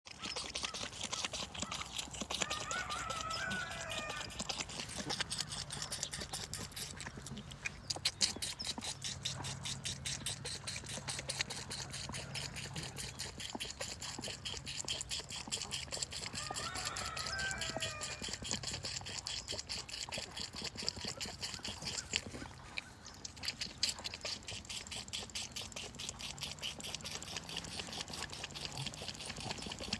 Soundscapes > Nature
Charlie Chaplin the baby sheep sucking bottle